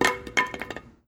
Sound effects > Objects / House appliances
WOODImpt-Samsung Galaxy Smartphone, CU Board Drop 02 Nicholas Judy TDC
A wooden board drop.